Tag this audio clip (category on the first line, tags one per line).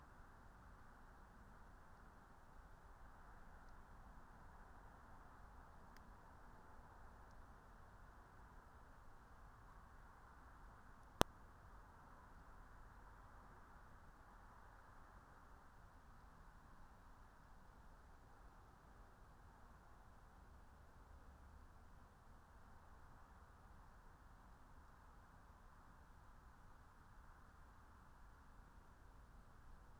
Soundscapes > Nature
raspberry-pi
nature
phenological-recording
soundscape
meadow
natural-soundscape
field-recording
alice-holt-forest